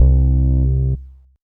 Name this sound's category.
Instrument samples > Synths / Electronic